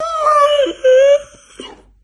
Sound effects > Animals
Dog Yawning
Malinois (Belgian Shepherd) dog sitting in front of camera and yawning during training, perhaps being annoyed or bored or impatient. Friend's iPhone video recording, used with permission, cut in Audacity.
nervous, pet, doggie, bored, sleepy, yawyning, dog, tired, yawn, impatience, impatient, animal